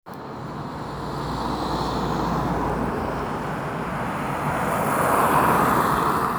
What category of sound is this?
Soundscapes > Urban